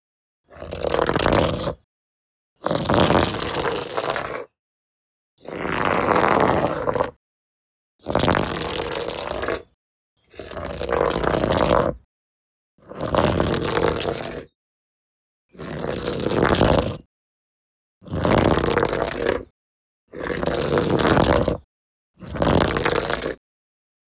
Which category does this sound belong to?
Sound effects > Other